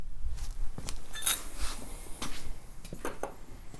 Sound effects > Other mechanisms, engines, machines
metal shop foley -234
bam, bang, bop, crackle, foley, metal, oneshot, perc, percussion, rustle, sound, strike, tink, tools